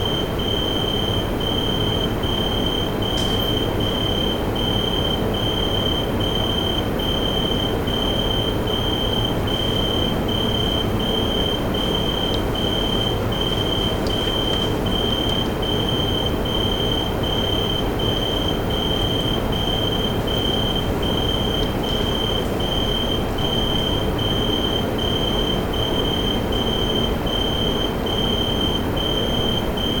Soundscapes > Urban
250811 04h02 Albi 71 Bd Alsace Lorraine - Night ambience NT5o
Subject : Date YMD : 2025 August 11 Early morning : 04h02 Location : Albi 81000 Tarn Occitanie France. NT5 with a omni capsule (NT5-o). Weather : 24°c ish 60% humidity clear sky, little to no wind (said 10km/h, most locations I was was shielded) Processing : Trimmed and normalised in Audacity.